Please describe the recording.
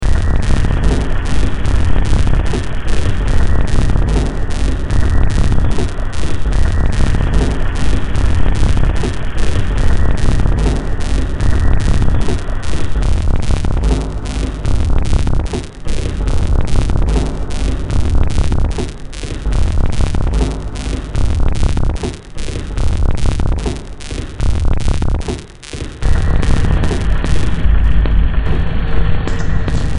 Music > Multiple instruments
Demo Track #3200 (Industraumatic)
Industrial, Sci-fi, Soundtrack, Games, Noise, Cyberpunk, Ambient, Horror, Underground